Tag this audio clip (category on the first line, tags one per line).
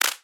Instrument samples > Synths / Electronic
electronic
synthetic
surge
fm